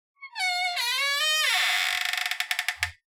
Sound effects > Other mechanisms, engines, machines
Squeaky Hinge
creaks, hinge, squeaky